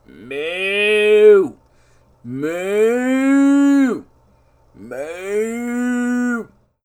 Sound effects > Animals
TOONAnml-Blue Snowball Microphone, CU Cow, Mooing, Human Imitation, Cartoon Nicholas Judy TDC
A cow mooing. Human imitation.
Blue-brand, Blue-Snowball, bull, cartoon, cow, human, imitation, moo